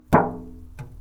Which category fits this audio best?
Sound effects > Other mechanisms, engines, machines